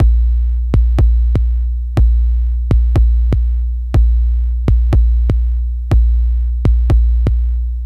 Music > Solo percussion
122 606Mod-BD Loop 04
Analog, Loop, Vintage, Bass, 606, Modified, Kit, Mod, Drum, music, Synth, DrumMachine, Electronic